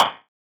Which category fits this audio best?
Instrument samples > Percussion